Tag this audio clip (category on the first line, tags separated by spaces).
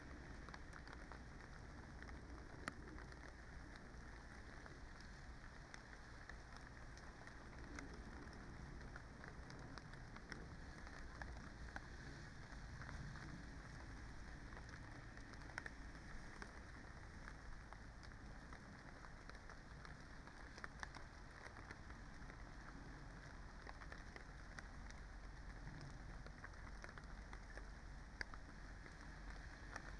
Soundscapes > Nature
phenological-recording field-recording natural-soundscape nature alice-holt-forest modified-soundscape data-to-sound raspberry-pi soundscape Dendrophone artistic-intervention weather-data sound-installation